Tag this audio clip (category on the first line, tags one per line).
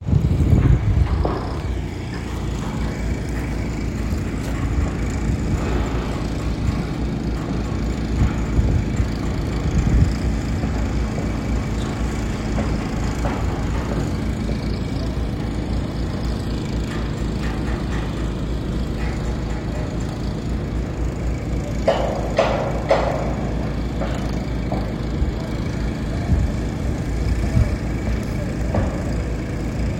Sound effects > Other mechanisms, engines, machines
Construction
equipment
hardware
live-wire
saw
trucks
working